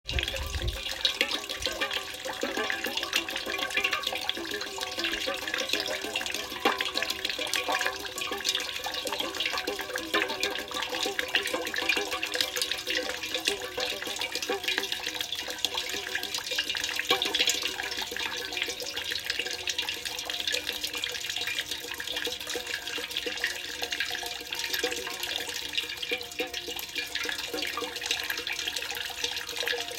Soundscapes > Nature
Water, drips, rain, drumming, garage buckets 03/29/2023
sound of drips
collecting-watter, drops, rain, raining, water, water-drum